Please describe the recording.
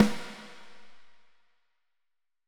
Music > Solo percussion
Snare Processed - Oneshot 53 - 14 by 6.5 inch Brass Ludwig
ludwig, drumkit, snareroll, snares, reverb, oneshot, acoustic, crack, brass, snare, flam, rim, percussion, sfx, realdrums, fx, realdrum, hit, kit, snaredrum, hits, drums, perc, rimshots, processed, roll, beat, drum, rimshot